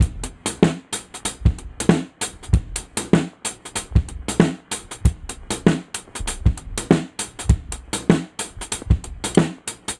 Music > Solo percussion
bb drum break loop thicc 96
96BPM, Acoustic, Break, Breakbeat, Drum, DrumLoop, Drums, Drum-Set, Lo-Fi, Vintage, Vinyl